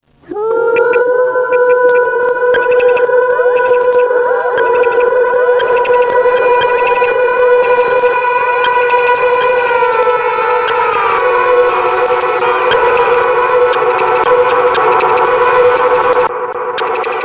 Speech > Processed / Synthetic
wrecked vox 8
A heavily processed vocal effect recorded using an SM Beta 57a microphone into Reaper, Processed with a myriad of vst effects including Shaperbox, Infiltrator, Fab Filter, etc
alien, atmosphere, growl, monster, vocals